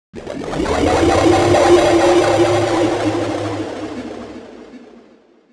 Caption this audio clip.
Sound effects > Electronic / Design
Optical Theremin 6 Osc ball infiltrated-008
Alien, Analog, Bass, Digital, DIY, Dub, Electro, Electronic, Experimental, FX, Glitch, Glitchy, Handmadeelectronic, Infiltrator, Instrument, Noise, noisey, Optical, Otherworldly, Robot, Robotic, Sci-fi, Scifi, SFX, Spacey, Sweep, Synth, Theremin, Theremins, Trippy